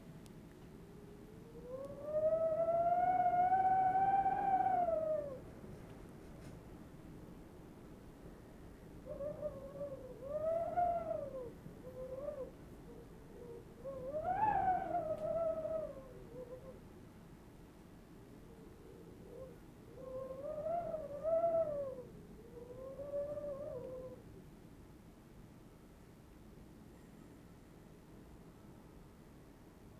Soundscapes > Other
Wind from Inside Tent